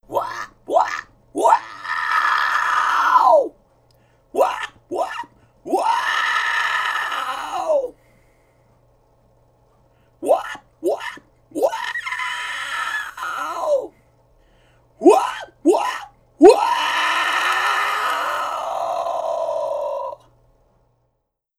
Sound effects > Animals
A harpy crying. Human imitation.
scream, harpy, mystery, Blue-brand, horror, cry, woman, Blue-Snowball